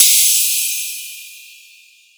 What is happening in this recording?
Instrument samples > Percussion
Magical Cymbal10
Cymbal, Enthnic, FX, Magical, Percussion, Synthtic